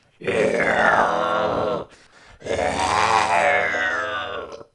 Sound effects > Human sounds and actions
Loud Zombie 3
Decided to do some more zombie sounds! Just remember to give me a credit and all is good.
horror, monster, zombie, undead